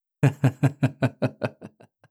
Sound effects > Human sounds and actions
Mocking Laughter
Evil laughter by any villain in storys.
laugh, insane, giggle, laughter